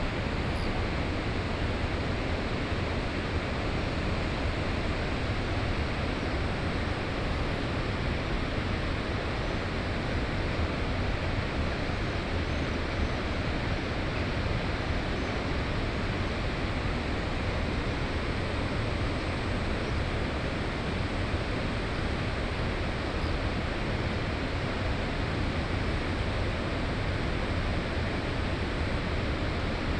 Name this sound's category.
Sound effects > Natural elements and explosions